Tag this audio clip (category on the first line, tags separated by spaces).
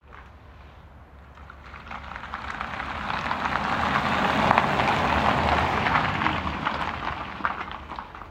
Sound effects > Vehicles
driving electric vehicle